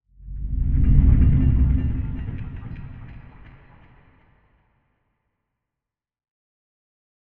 Sound effects > Electronic / Design
Sound From The Depths 2
what-the, scary-sound, startling-sound, startled, horror-impact, spooky-sound, loud-jumpscare-sound, startled-noise, jumpscare-sound, whack-hectic-guy, horror-hit, horror-stab, cinematic-sting, horror-sting, jumpscare-sound-effect, jumpscare, cinematic-hit, horror-sound, thrill-of-fear, spooky-cinematic-sting, Dylan-Kelk, sound-from-the-depths, jumpscare-noise, cinematic-stab, Lux-Aeterna-Audio, underground-sound, loud-jumpscare, spooky-hit